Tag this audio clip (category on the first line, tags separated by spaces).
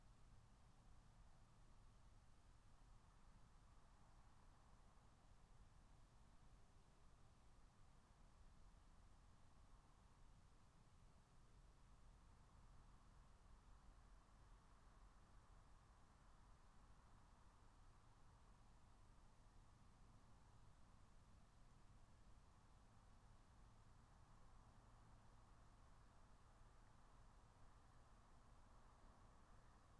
Soundscapes > Nature
alice-holt-forest meadow natural-soundscape nature phenological-recording raspberry-pi